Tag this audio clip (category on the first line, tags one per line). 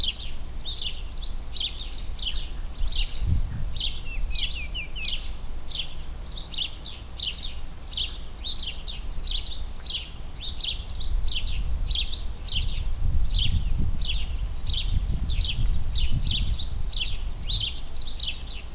Sound effects > Animals
bird
birdcall
birds
birdsong
chirp
chirping